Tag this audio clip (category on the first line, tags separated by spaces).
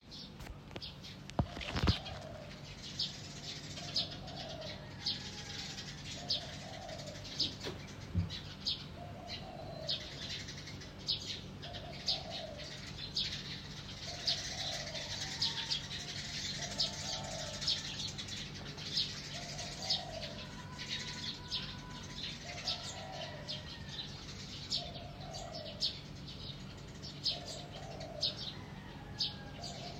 Soundscapes > Urban
Ambiance Ambience Asia Bali Birds Calm Countryside Free Indonesia Island Nature Town Travel Uluwatu